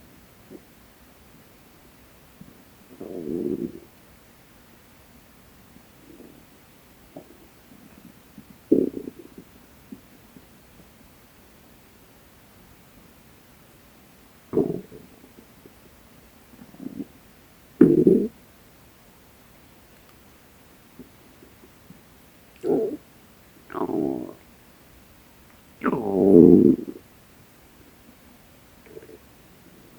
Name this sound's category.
Sound effects > Human sounds and actions